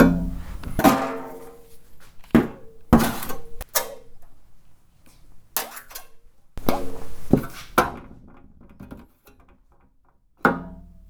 Sound effects > Other mechanisms, engines, machines
handsaw foley oneshot sequence tones stabs notes
smack, vibe, shop, perc, twangy, percussion, twang, saw, hit, household, handsaw, metallic, tool, metal, plank, vibration, fx, foley, sfx